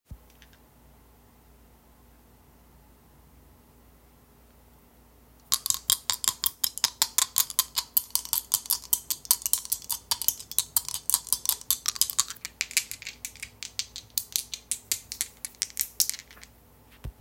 Sound effects > Objects / House appliances
Shaking 2 dice closed and open handed
Shaking 2 dice. Starts with closed hands, then at around #0:12 I opened up my hand to get a different sound.